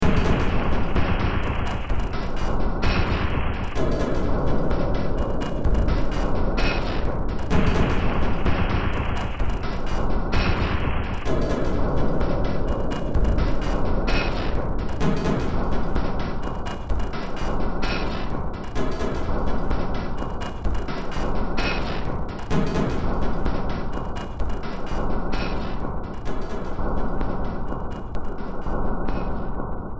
Music > Multiple instruments
Demo Track #3035 (Industraumatic)
Ambient, Cyberpunk, Games, Horror, Industrial, Noise, Sci-fi, Soundtrack, Underground